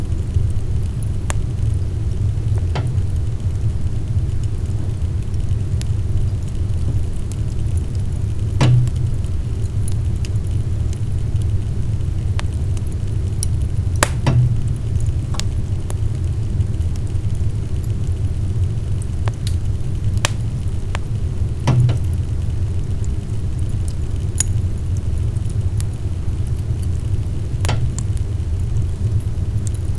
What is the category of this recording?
Sound effects > Natural elements and explosions